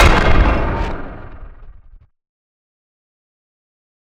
Sound effects > Other
Sound Design Elements Impact SFX PS 103
audio, blunt, cinematic, collision, crash, design, effects, explosion, force, game, hard, heavy, hit, impact, percussive, power, rumble, sfx, sharp, shockwave, smash, sound, strike, thudbang, transient